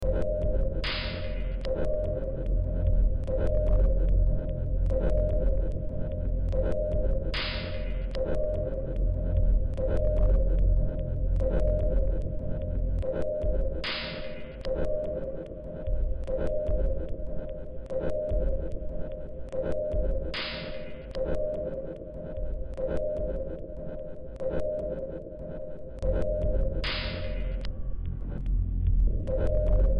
Music > Multiple instruments
Demo Track #3048 (Industraumatic)
Cyberpunk, Sci-fi, Industrial, Noise, Soundtrack, Games, Ambient, Horror, Underground